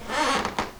Sound effects > Objects / House appliances
Creaking Floorboards 10
creaking, hardwood, screech, room, floor, grate, weight, squeaking, rub, going, flooring, walk, footstep, squeal, floorboard, old, grind, bare-foot, groan, floorboards, squeak, scrape, wooden, old-building, wood, heavy, squeaky, walking, footsteps, creaky